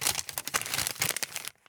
Sound effects > Objects / House appliances
receipt handling1
The crinkling of a receipt.
store, crinkling, handling, receipt